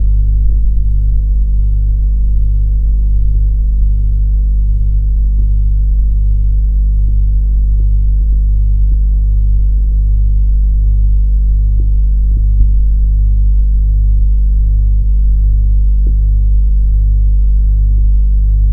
Sound effects > Objects / House appliances
Electronic House Fan Controller via Low Frequency Geo Microphone
The control unite of the house fan recorded with a low frequency microphone which I placed on top of it with a magnet This sound is recorded with a Low Frequency Geo Microphone. This microphone is meant to record low-frequency vibrations. It is suitable for field recording, sound design experiments, music production, Foley applications and more. Frequency range: 28 Hz - >1000 Hz.
electronic, electronics, fan, noise